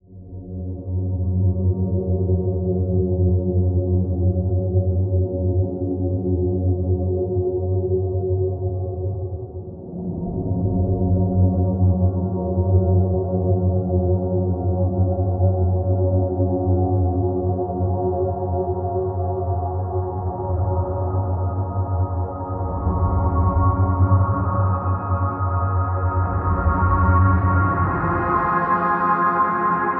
Soundscapes > Synthetic / Artificial
Complex shifting ambient drone 4
Calm major-to-minor looping drone made with layered and processed synths. Use as is or sample/edit/rework as you wish. See profile for more details.